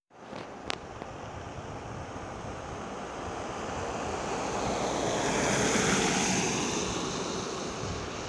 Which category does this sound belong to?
Sound effects > Vehicles